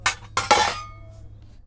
Sound effects > Objects / House appliances
Junkyard Foley and FX Percs (Metal, Clanks, Scrapes, Bangs, Scrap, and Machines) 25
garbage, Robot, Clang, scrape, tube, trash, Clank, Atmosphere, Dump, Environment, Bang, FX, Smash, Foley, Junk, Junkyard, rubbish, SFX, waste, Metallic, Machine, dumpster, Robotic, Ambience, Perc, rattle, Metal, dumping, Percussion, Bash